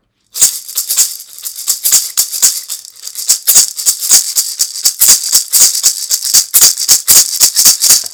Instrument samples > Percussion
Cuba maracas 1 (MacBookAirM1 microphone in Reaper’s DAW)
percussion, sample